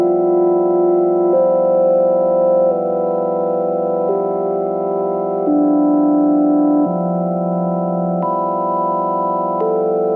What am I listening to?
Soundscapes > Synthetic / Artificial
April 4th 0 o'clock
Midnight forest sonification from April 4th, 2025 (00:00), with pitch shaped by air temperature and CO₂, rhythm from sunlight, vibrato from radiation, and tonal color from wind and humidity.